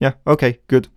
Speech > Solo speech
Relief - Yeah okay good 2
dialogue, FR-AV2, Human, Male, Man, Mid-20s, Neumann, NPC, oneshot, phrase, relief, singletake, Single-take, talk, Tascam, U67, Video-game, Vocal, voice, Voice-acting